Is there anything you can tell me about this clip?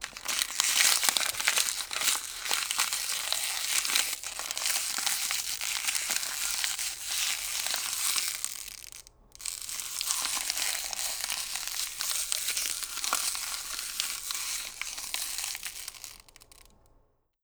Sound effects > Other
TOONMisc-Blue Snowball Microphone, CU Crumbling Nicholas Judy TDC
crumple, crumble, cartoon, Blue-brand